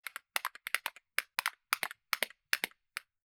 Instrument samples > Percussion
MusicalSpoon Large Loose Gallop
Recorded On Zoom H5 XY5, AT897 Shotgun Mic, and SM57, and then Summed to Mono (all mics aprox < 3 feet away From source) Denoised and Deverbed With Izotope RX 11
Gallop, Hit, Horse, Minimal, Musical, Percussion, Slap, Spoon, Strike, Wood